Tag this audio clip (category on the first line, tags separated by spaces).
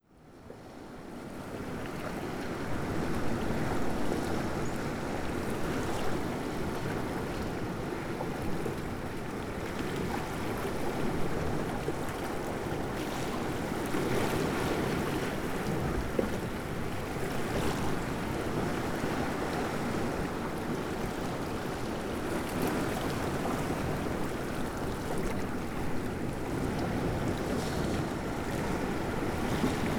Soundscapes > Nature
ocean seaside waves coast field-recording sea water pipit